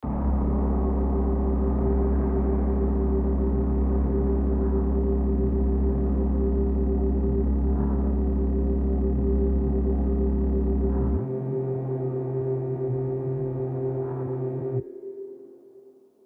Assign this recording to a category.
Instrument samples > Synths / Electronic